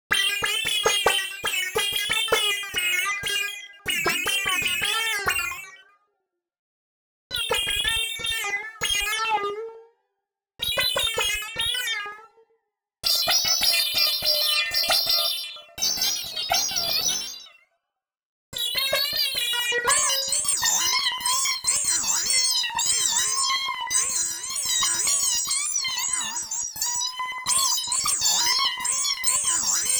Sound effects > Electronic / Design
Alien talking sound effect
alien sound effect created using stacked synth patches on Expand
funny, martian, alien